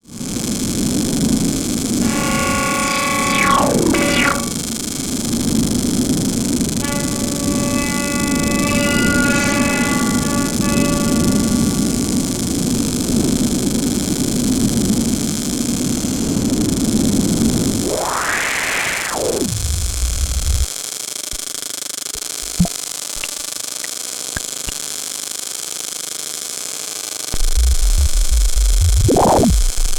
Sound effects > Electronic / Design
The 'Dustmites Chorale' pack from my 'Symbiotes' sampler is based on sounds in which the dominant feature is some form of surface noise, digital glitch, or tape hiss - so, taking those elements we try to remove from studio recordings as our starting point. This excerpt is a nice little etude pairing different types of mild noise: the more "up-front" variety is pulsar synthesis courtesy of a Hieroglyphic Plume module, and underneath there is a current of pink noise. Some random FM'd wavelets seem to pop up in the mix also.